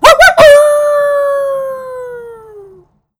Sound effects > Human sounds and actions
TOONAnml-Blue Snowball Microphone, CU Coyote, Howl, Human Imitation Nicholas Judy TDC
A coyote howling. Human imitation.
Blue-brand, Blue-Snowball, coyote, howl, human, imitation